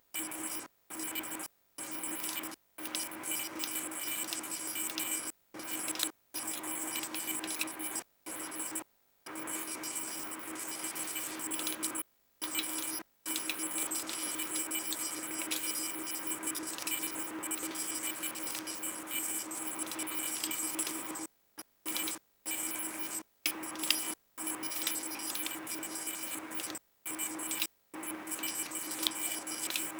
Sound effects > Other
DV audio artifacts
Artifact/error sounds of a damaged part of a DV video cassette. Played back using the Sony HDV FX-1. Audio capture done via Blackmagic Design Decklink Studio 4K
artifact, DV, glitch, noise, tape